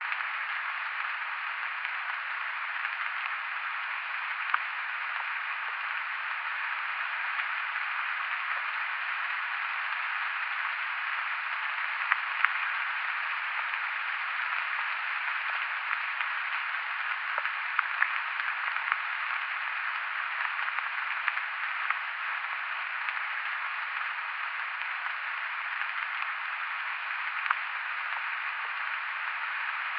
Sound effects > Electronic / Design
Phone call background noise
Handy for putting behind a phone call dialogue/voicemail/sound design, or simply used on its own as noise. Recorded using an old landline telephone with audio output to the studio interface.